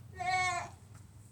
Sound effects > Animals
Goats - Kid Bleat, Medium Perspective
A goat kid bleats, which was recorded using an LG Stylus 2022.